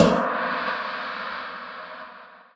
Instrument samples > Percussion
A crashgong to be used in metal/rock/jazz music. Shortened version of the namesake soundfile. tags: crashgong gongcrash ride crash China sinocymbal cymbal drum drums crash-gong gong gong-crash brass bronze cymbals Istanbul low-pitched Meinl metal metallic Sabian sinocymbal Sinocymbal smash Soultone Stagg synthetic unnatural Zildjian Zultan